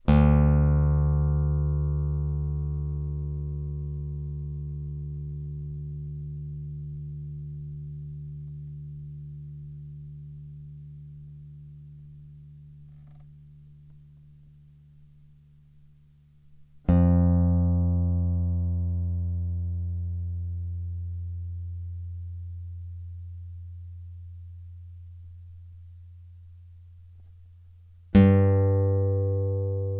Instrument samples > String
Nylon Plucks
Individual plucked notes of Cordoba C7-CE electro-acoustic classical guitar, factory fresh with no setup. Nylon strings, tuned to open D major (D-A-D-F#-A-D), I plucked each string open and at the marked frets. Recorded in a small room using built in piezo pickup, internal microphone and Fishman Blend preamp. The action is quite high, pushing the intonation sharp as I move up the fretboard, particularly for the higher pitched strings. I edited out the gaps between notes, but there is still some fret buzz, handling noise and environmental sounds.